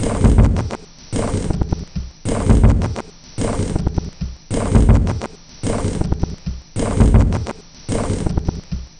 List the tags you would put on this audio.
Instrument samples > Percussion
Packs Industrial Underground Loopable Ambient Samples Weird Soundtrack Dark Loop Drum Alien

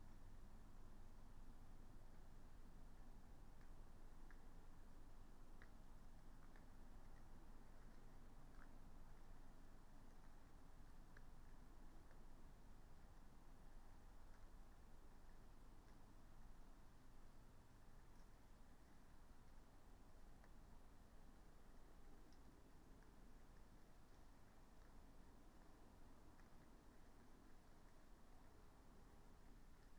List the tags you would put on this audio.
Soundscapes > Nature
soundscape field-recording nature modified-soundscape artistic-intervention natural-soundscape sound-installation raspberry-pi Dendrophone data-to-sound alice-holt-forest phenological-recording weather-data

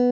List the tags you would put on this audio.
Instrument samples > String
cheap guitar sound design tone stratocaster

